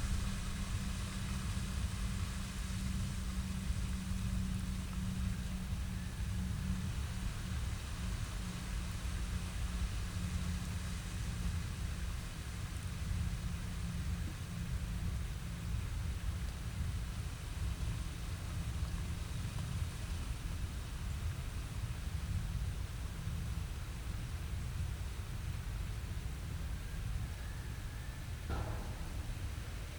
Soundscapes > Nature
20250906 06h36 Gergueil Garden ambience DJI single
Subject : Ambience recording from a garden in Gergueil. Recorded from under a wheel barrow. Date YMD : 2025 September 06 at 06h36 Location : Gergueil 21410 Bourgogne-Franche-Comte Côte-d'Or France Hardware : Dji Mic 3 internal recording. Weather : Processing : Trimmed and normalised in Audacity.
mic3, rural, Omni, Dji-Mic3, garden, Bourgogne, Bourgogne-Franche-Comte, country-side, Cote-dOr, 21410, Gergueil, Dji, countryside, outdoor, France, Night